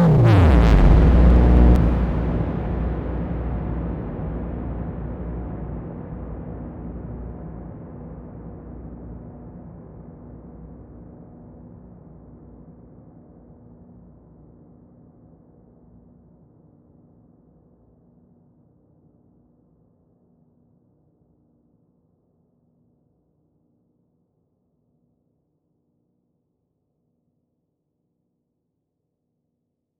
Sound effects > Other mechanisms, engines, machines
I built a Wien Bridge oscillator one day out of idleness and a craving for solder smoke. Then, I recorded it. Some of the transitional moments I found dramatic. I also felt reverb-dramatic, so I added a lot.